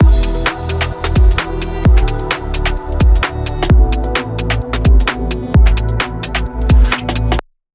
Music > Multiple instruments
cool piece for a uk garage beat. Part of a whole beat. AI generated: (Suno v4) with the following prompt: generate an upbeat instrumental inspired in the uk garage genre, at 130 bpm, in E minor.